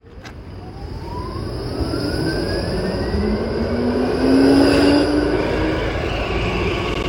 Soundscapes > Urban
Tram passing Recording 19
The sound comes from a tram moving along steel rails, produced mainly by wheel–rail contact, the electric drive, and braking systems. It is characterized by a low-frequency rolling rumble, and rhythmic rail noise with occasional high-pitched braking squeals as the tram passes. The recording was made outdoors near a tram line in Hervanta, Tampere, using recorder in iPhone 12 Pro Max. The purpose of the recording is to provide a clear example of a large electric vehicle pass-by for basic audio processing and movement-related sound analysis.